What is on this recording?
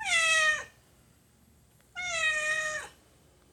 Sound effects > Animals
House Cats - White Tomcat; Two Meows
The sound effect of a pet cat meowing.
cat, feline, meow, pet, pets, Tomcat